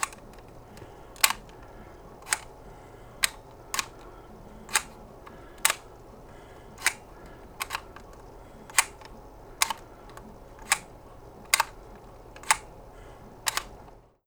Sound effects > Objects / House appliances
COMCam-Blue Snowball Microphone, CU Nickelodeon Photo Blaster, Cover, Slide On, Off Nicholas Judy TDC
A Nickelodeon Photo Blaster camera cover sliding on and off.